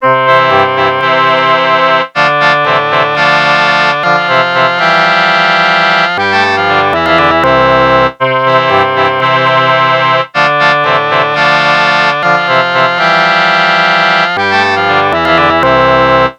Music > Solo instrument
Happy Accordion
An up-beat loop i made. INFO: there are only 1 instrument (obviously). Accordion: It was kinda hard to make an accordion sound, but i did it.
Happy, Accordion, Loop